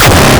Instrument samples > Percussion
Industrial Hardtechno Kick 1
Stupid sound synthed with phaseplant randomly.
Distorted, Hardcore, Kick, Aggressive, Hardtechno, rawstyle